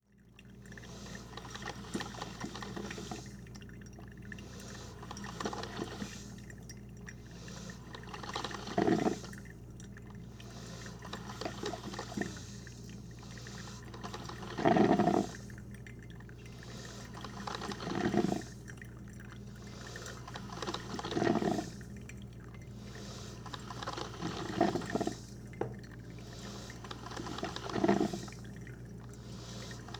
Sound effects > Objects / House appliances
Percolator (full run)
The full run of a percolator making 2 cups of coffee.
appliances, coffee, percolator, brewing, espresso